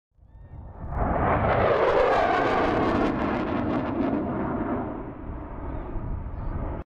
Sound effects > Vehicles
Jet Flyby 01
aircraft, airplane, flyby, flying, jet, plane